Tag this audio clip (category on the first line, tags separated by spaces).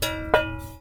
Sound effects > Objects / House appliances
trash Metal Perc dumping Junk garbage Robotic Clank Bash Environment Bang Junkyard Machine Metallic Smash Atmosphere scrape Percussion Foley Robot Ambience FX rubbish tube